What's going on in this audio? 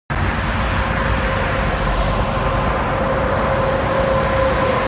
Sound effects > Vehicles
Sun Dec 21 2025 (9)
road, highway